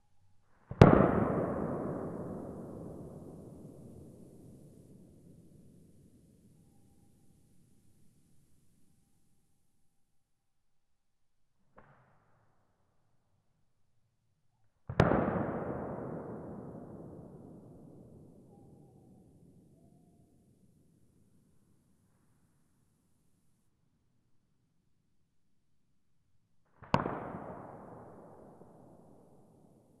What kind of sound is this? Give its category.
Sound effects > Natural elements and explosions